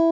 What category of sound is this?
Instrument samples > String